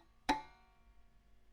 Instrument samples > String
Flicking the wood of a broken violin.